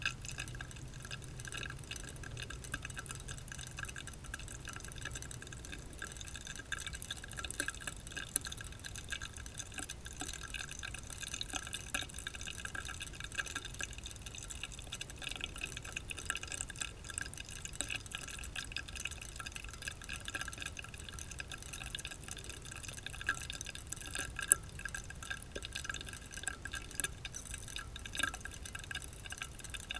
Sound effects > Natural elements and explosions
Sodacan fizz (RAW)
Subject : A tall 33cl soda can, recorded pointing slightly down to the opening. Date YMD : 2025 July 23 In the Early morning. Location : France indoors. Sennheiser MKE600 P48, no filter. Weather : Processing : Trimmed and normalised in Audacity.
Shotgun-microphone, 33cl, coke, Shotgun-mic, Sennheiser, Single-mic-mono, tall-soda-can